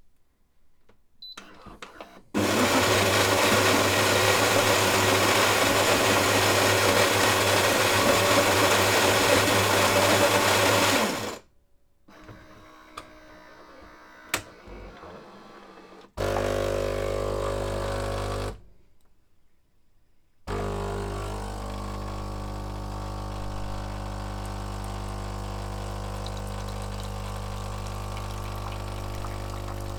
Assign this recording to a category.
Sound effects > Objects / House appliances